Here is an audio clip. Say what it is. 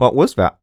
Speech > Solo speech
Confused - what was that
Subject : A mid20s male voice-acting for the first time. Check out the pack for more sounds. Objective was to do a generic NPC pack. Weather : Processing : Trimmed and Normalized in Audacity, Faded in/out. Notes : I think there’s a “gate” like effect, which comes directly from the microphone. Things seem to “pop” in. Also sorry my voice-acting isn’t top notch, I’m a little monotone but hey, better than nothing. I will try to do better and more pronounced voice acting next time ;) Tips : Check out the pack!
confused, dialogue, FR-AV2, Human, Male, Man, Mid-20s, Neumann, NPC, oneshot, sentence, singletake, Single-take, talk, Tascam, U67, Video-game, Vocal, voice, Voice-acting, words